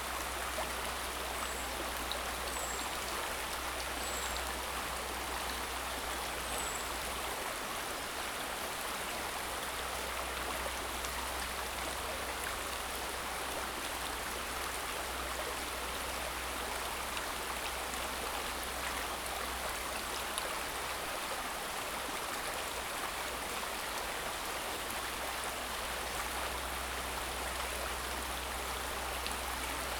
Soundscapes > Nature
20250519 12h35 Albi - Lechappee vert - Niveau Est cimetière-Planques
Subject : Date : 2025 05/May 19 around 13h Location : Albi 81000 Tarn Occitanie France Weather : Hardware : Zoom H2n on a "gooseneck/clamp" combo for action cams. Processing : Trim and normalised.
81000, Afternoon, albi, ambience, Early-afternoon, France, Occitanie, urbain-nature, urbain-park, Zoom